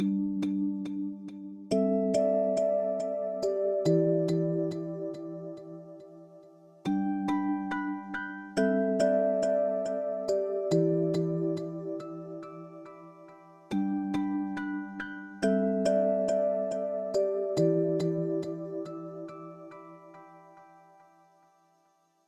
Music > Solo instrument
Frutiger Aero Incoming Call Ringtone 03
Incoming call ringtone in the style of early 2000s Frutiger aero. 140 bpm, made in FL Studio using only FL Keys, reverb, delay, EQ, and pitch control.
operating-system, tone, ringtone, incoming-call, skype, facetime, 2000s, aero, calling, frutiger, video-call, frutiger-aero, voice-call